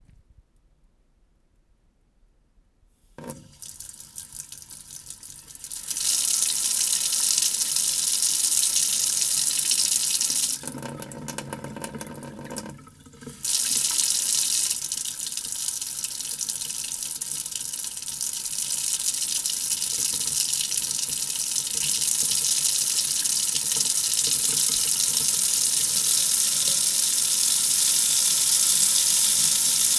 Sound effects > Objects / House appliances
Sink. water
Sink from kitchen Recorded that sound by myself with Recorder H1 Essential
Sink, Sink-Water, stream